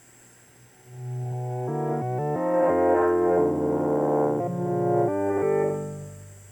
Instrument samples > Synths / Electronic
Spooky synth
Weird spooky microfreak sounds